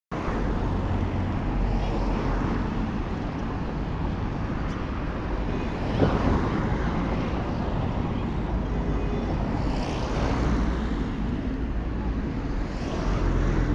Soundscapes > Urban
20240429 0919 cars phone microfone take1
trafic near Cinema Verde in Viana do Castelo, captured at 2 meters with a phone microfone
atmophere, field